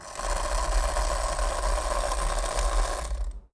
Sound effects > Objects / House appliances
A wacky wake-up alarm clock vibrating.